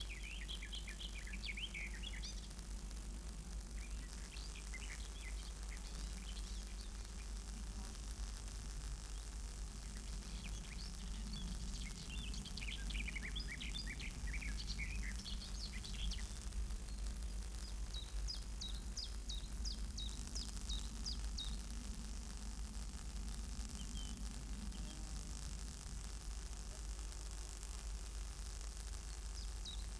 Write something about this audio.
Soundscapes > Urban
Ligne Haute Tension 3 avion
Electric noise & hum of an overhead power line in the countryside; birds in the background. A plane passing. Le bourdonnement et le grésillement d'une ligne à haute tension, dans la campagne bourguignonne. Des oiseaux chantent dans le fond.